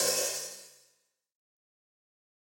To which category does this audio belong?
Instrument samples > Percussion